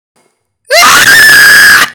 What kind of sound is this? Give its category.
Sound effects > Human sounds and actions